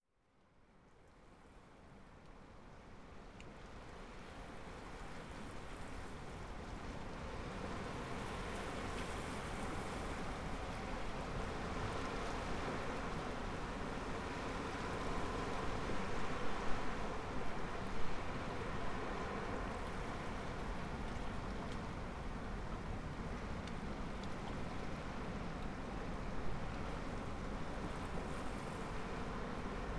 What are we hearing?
Soundscapes > Nature
Beach, waves rolling in across stereo field, close lapping waves, occasional gulls flying to and from distant cliffs
Standing perpendicular to shoreline with the waves going left to right. Recorded with: Tascam DR-05x, no processing.
beach, beachscape, coast, ocean, scotland, sea, seascape, seaside, shore, stranaer, waves